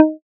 Instrument samples > Synths / Electronic
APLUCK 4 Eb
additive-synthesis, fm-synthesis, pluck